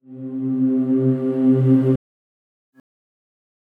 Instrument samples > Synths / Electronic
Deep Pads and Ambient Tones10
Synthesizer, Dark, Ambient, Ominous, Haunting, Pads, Note, Deep, Oneshot, synthetic, Digital, Analog, bassy